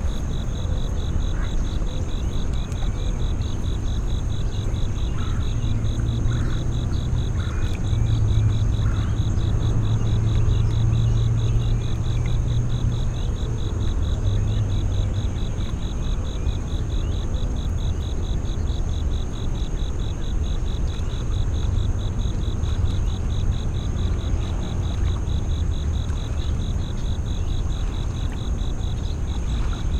Soundscapes > Urban
AMBSea-Summer Early Morning, Intracoastal Wateray shoreline, nearby bridge traffic, waves lapping, birds, insects, 6AM QCF Gulf Shores Alabama Zoom F3 with LCT 440 Pure
Early morning along the Intracoastal Waterway, Gulf Shores, Alabama. Distant bridge traffic, water lapping, birds, insects, 6:00AM
birdsong, bridge, crickets, field-recording, insects, intracoastal-waterway, morning, summer, traffic